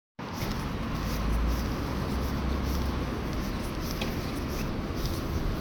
Soundscapes > Urban
car sound 5
Car, passing, studded, tires